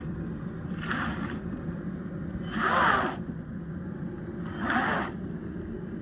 Sound effects > Vehicles
final bus 28
bus, finland, hervanta